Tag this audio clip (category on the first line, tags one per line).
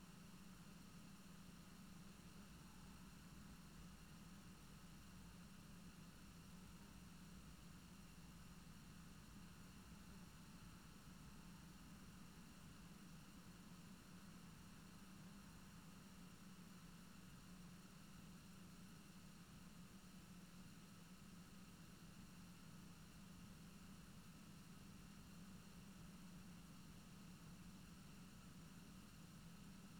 Soundscapes > Nature
soundscape
nature
raspberry-pi
alice-holt-forest
natural-soundscape
field-recording
phenological-recording
meadow